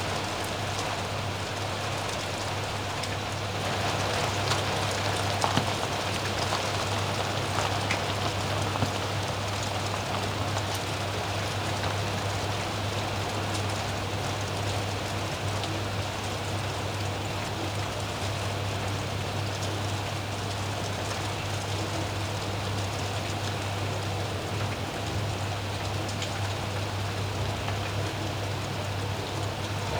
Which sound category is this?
Soundscapes > Nature